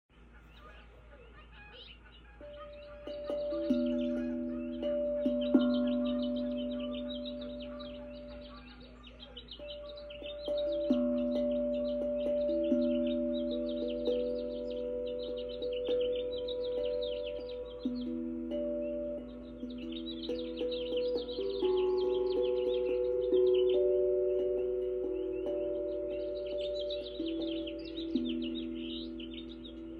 Music > Solo instrument
Water Fire - Ambient Tongue Drum
Handpan, Reverb, TongueDrum, Ambient